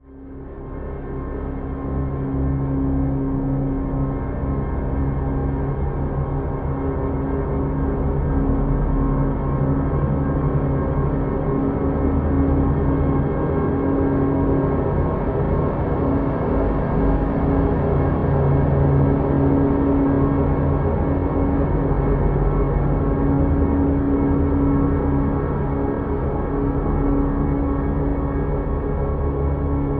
Sound effects > Electronic / Design
A dark, evolving drone created using various plugins.